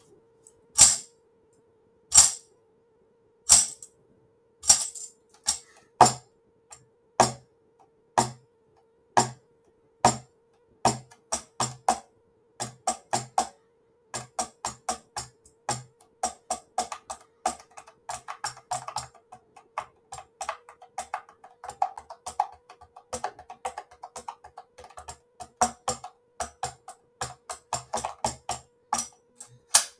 Instrument samples > Percussion
Alex plays the tambourine. Use for your music pieces, songs, compositions, musicals, operas, games, apps